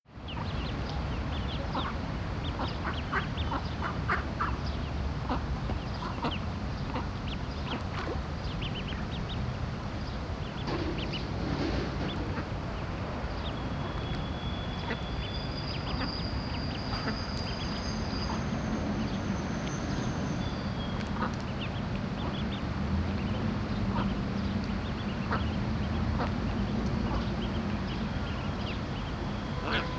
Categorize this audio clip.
Soundscapes > Urban